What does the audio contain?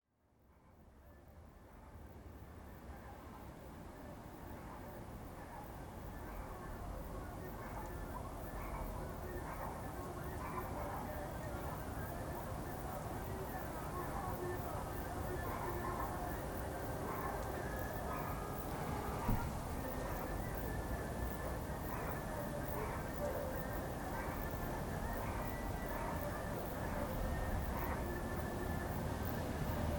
Soundscapes > Urban
1st of September 2025 evening sounds. Recorded by SONY ICD-UX512 Stereo dictaphone.
evening, suburbs, suburban, ambience, night